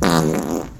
Sound effects > Human sounds and actions
A big, undulating fart.